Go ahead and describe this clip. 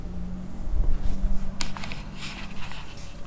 Sound effects > Other mechanisms, engines, machines
metal shop foley -237
bam, bang, boom, bop, crackle, foley, fx, knock, little, metal, oneshot, perc, percussion, rustle, sfx, shop, sound, strike, thud, tink, tools, wood